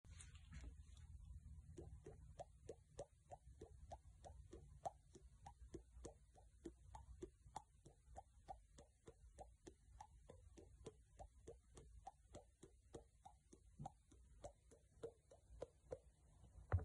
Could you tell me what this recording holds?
Sound effects > Experimental
drops of water or any liquid I made with my mouth for a college project.
drip, rain, water